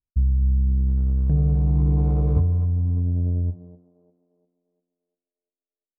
Instrument samples > Synths / Electronic
Left Ear
Low deep sound almost fog hornish panned hard left
ambiance, dark, left